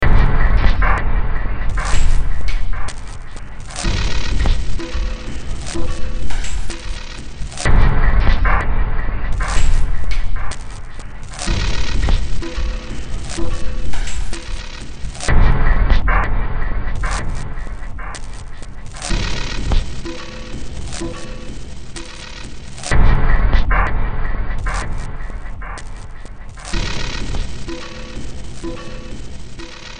Music > Multiple instruments
Demo Track #3155 (Industraumatic)
Ambient, Cyberpunk, Games, Horror, Industrial, Noise, Sci-fi, Soundtrack, Underground